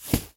Sound effects > Objects / House appliances
MAGPoof-Samsung Galaxy Smartphone, CU Comical Nicholas Judy TDC
A cartoon poof. Simulated using a pom-pom hitting the floor.